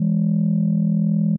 Instrument samples > Synths / Electronic
Landline Phonelike Synth E4
Tone-Plus-386c, just-minor-3rd